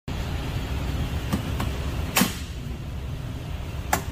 Sound effects > Other mechanisms, engines, machines
This sound captures the mechanical click when an electric panel switch is shut off.